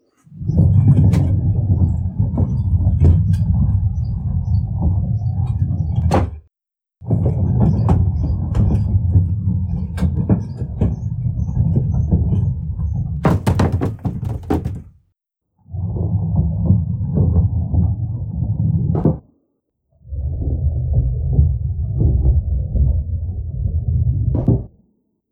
Sound effects > Other
Barrel rolling - Havoc Bazaar
A barrel rolling across a wooden floor. The first two have small metal parts that scrape as they roll. The last one (normal and slow motion) has none. I needed these sound effects to create chaos inside a ship. This one focuses on barrels, but you'll also find baskets falling in another audio, brooms, furniture and wood or cardboard boxes. * No background noise. * No reverb nor echo. * Clean sound, close range. Recorded with Iphone or Thomann micro t.bone SC 420.
attic, barrel, barrels, bazaar, cargo, cask, catastrophe, chaos, clutter, crash, devastation, disaster, disorder, fall, flooring, havoc, jumble, loads, mayhem, muddle, percussive, roll, rolling, shipwreck, stock, tragedy, tragic, wreakage